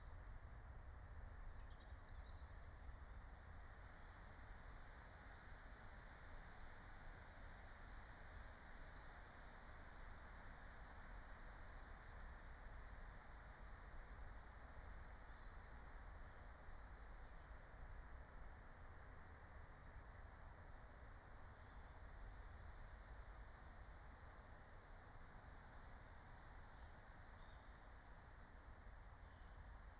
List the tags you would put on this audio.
Soundscapes > Nature
soundscape
meadow
raspberry-pi
alice-holt-forest
natural-soundscape

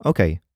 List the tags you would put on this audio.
Speech > Solo speech
2025
Adult
Calm
FR-AV2
Generic-lines
Hypercardioid
july
Male
mid-20s
MKE-600
MKE600
ok
okay
Sennheiser
Shotgun-mic
Shotgun-microphone
Single-mic-mono
Tascam
VA
Voice-acting

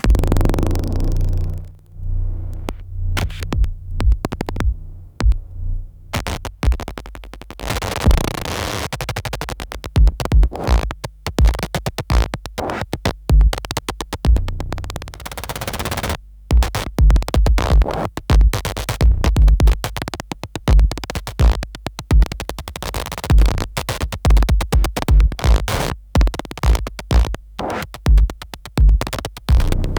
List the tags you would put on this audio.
Soundscapes > Synthetic / Artificial
AI-generated
atonal
capacitor
electronic
electronica
electronics
experimental
glitch
inductor
noise
pcb

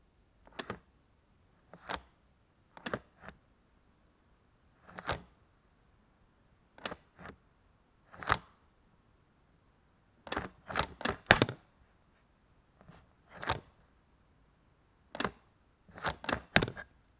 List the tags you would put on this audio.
Sound effects > Human sounds and actions
close
foley
lock
open
snap
suitcase

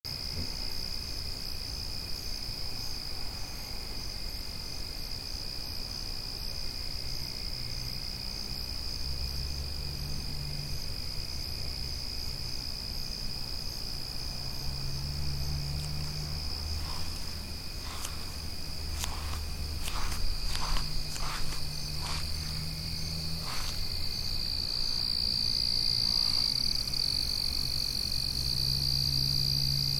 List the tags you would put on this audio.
Sound effects > Natural elements and explosions
bugs
chirping
nature
night
insects
crickets

